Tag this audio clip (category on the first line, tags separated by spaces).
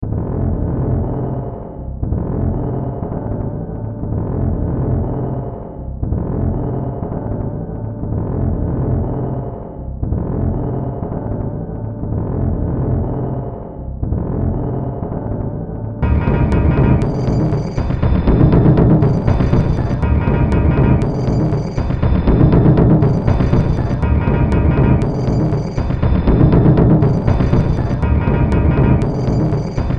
Music > Multiple instruments
Cyberpunk Underground Games Horror Soundtrack Industrial Ambient Noise